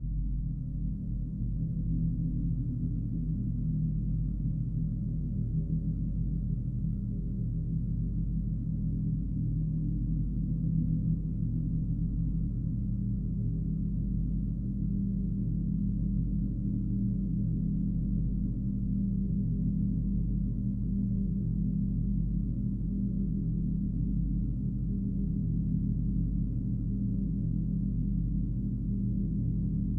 Soundscapes > Synthetic / Artificial
Dark Drone 2
Recorded with LOM Geofon, fridge and pc.
DARK, DRONE, LOM